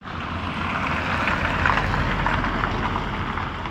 Sound effects > Vehicles
a combustionengine car driving by
car, driving